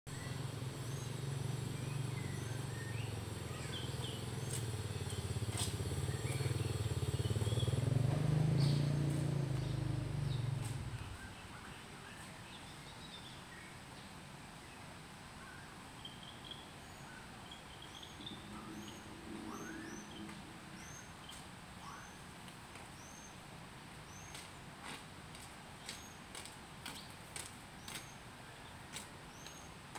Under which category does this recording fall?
Soundscapes > Urban